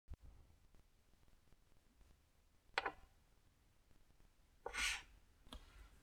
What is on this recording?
Sound effects > Objects / House appliances
A pawn being picked up and sliding one place across a wooden chessboard.